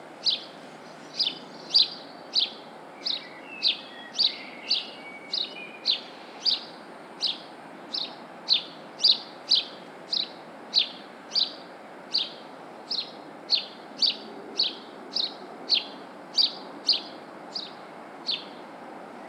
Soundscapes > Nature
Short Burst of Bird Twittering
Short clip of sparrow in an urban street, recorded on phone.
bird, chirp, sparrow, short-clip, twittering, tweet, tweeting